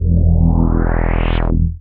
Sound effects > Electronic / Design
Another UX sound I made for a video game. This sound plays when the player loses the game. Something short, something easy.